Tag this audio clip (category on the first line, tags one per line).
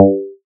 Instrument samples > Synths / Electronic
bass
fm-synthesis